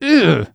Speech > Solo speech
Man Pain Muffled
This hurts but not too much. Male vocal recorded using Shure SM7B → Triton FetHead → UR22C → Audacity → RX → Audacity.
scream, yell, man, screaming, shouting, pain, voice, emotional, masculine, hurt, emotion, muffle, vocal, painful, male, surpressed, interjection